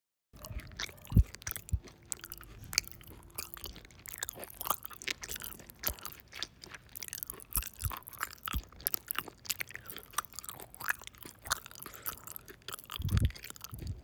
Sound effects > Objects / House appliances
FOODEat Chew crunch break crush crush food grind eat constant
chewing a crunchy, crushing banana, mashed and ground, producing a slight echo with each bite.